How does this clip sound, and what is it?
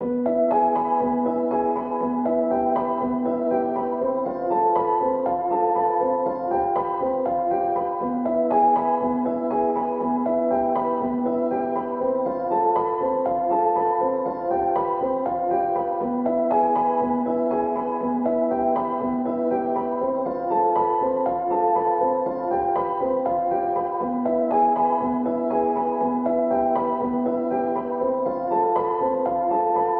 Music > Solo instrument
Piano loops 086 efect 4 octave long loop 120 bpm
reverb, 120, loop, free, piano, pianomusic, simple, simplesamples, 120bpm, samples, music